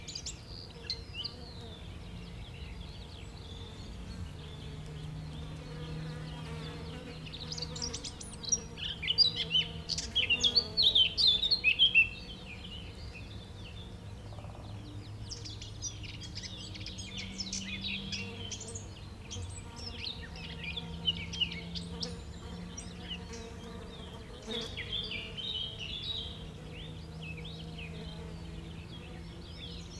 Soundscapes > Nature
250418 10h44 flying bugs West of Gergueil
Subject : At the edge between forest and field, there was a bush with a fair bit of bug activity. Date YMD : 2025 04 18 12H Location : Gergueil France. Hardware : Tascam FR-AV2, Rode NT5 ORTF Weather : Half clear half cloudy. Processing : Trimmed and Normalized in Audacity. Probably some fade in/out.
countryside, rural, Tascam, FR-AV2, cote-dor, country-side, field, bugs, ORTF, france